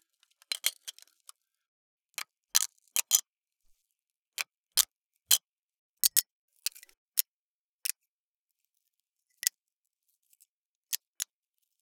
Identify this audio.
Sound effects > Other mechanisms, engines, machines
Glass Shards Moving
The sound of small glass plates and shards moving around. Made by taking the glass plates off of several small lanterns and moving them around. Could be useful for a character digging through glass, petrification, freezing sounds, or any variety of foley for spells.
sharp, window, movement, cracked, glass, shards, icy, ice, shard, freezing, frozen, pane, broken, dropped, petrification, shattered, petrify, statue